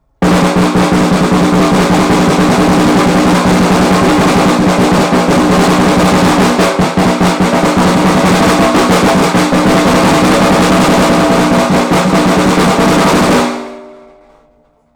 Music > Solo percussion
MUSCPerc-Blue Snowball Microphone, CU Drum, Snare, Roll Nicholas Judy TDC
A snare drum roll.
Blue-brand, Blue-Snowball, drum, roll, snare, snare-drum